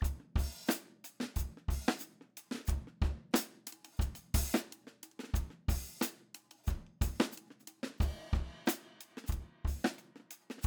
Music > Solo percussion
Simple groove 90 BPM in 4
studio, drums, recording